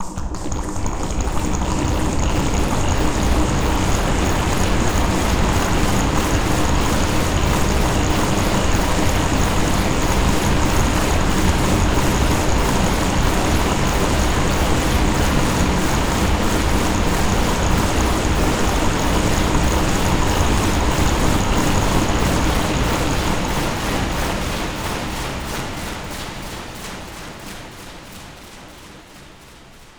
Music > Solo percussion
Simple Bass Drum and Snare Pattern with Weirdness Added 018
It's pretty much all in the name (and the tags). I took a simple 4/4 beat, snare on 1 and 3, bass on 2 and 4, and then I added erratic chains of effects that I primarily determined aleatorically. The result is sometimes noisy, sometimes it's fun or simply strange, but perhaps it could be useful to you in some way.
Experimental, Simple-Drum-Pattern, Experimental-Production, FX-Drum-Pattern, FX-Drums, Noisy, Experiments-on-Drum-Beats, FX-Drum, Bass-and-Snare, Glitchy, Silly, Four-Over-Four-Pattern, FX-Laden-Simple-Drum-Pattern, Bass-Drum, FX-Laden, Fun, Interesting-Results, Experiments-on-Drum-Patterns, Snare-Drum